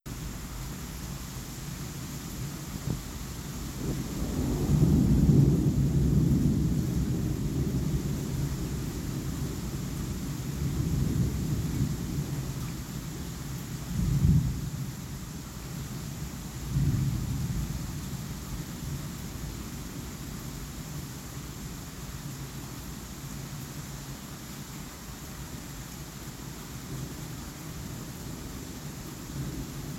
Soundscapes > Nature
STORM-Samsung Galaxy Smartphone, CU Thunderstorm, Heavy, Rain, Cicadas, Crickets Nicholas Judy TDC
Heavy thunderstorm and rain with cicadas and crickets.
cicadas, crickets, heavy, Phone-recording, rain, thunder, thunderstorm